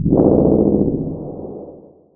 Instrument samples > Synths / Electronic
CVLT BASS 55
bass, bassdrop, clear, drops, lfo, low, lowend, stabs, sub, subbass, subs, subwoofer, synth, synthbass, wavetable, wobble